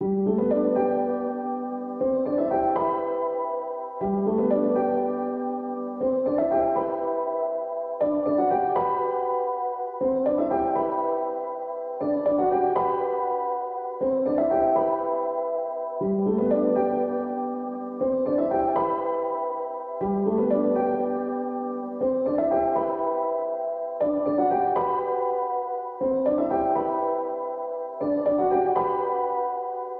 Music > Solo instrument
Piano loops 087 efect 4 octave long loop 120 bpm
samples, 120bpm, pianomusic, reverb, simple, free, music, piano, loop, simplesamples, 120